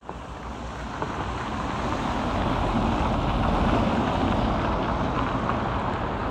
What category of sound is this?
Soundscapes > Urban